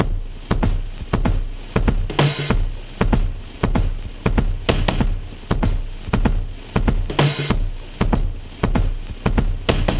Music > Solo percussion
bb drum break loop tibe 96
A short set of Acoustic Breakbeats recorded and processed on tape. All at 96BPM
Vintage, Drum-Set, Lo-Fi, Breakbeat, 96BPM, DrumLoop, Dusty, Vinyl, Drums, Acoustic, Drum, Break